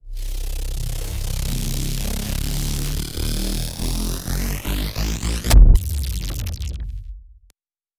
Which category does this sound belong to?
Sound effects > Other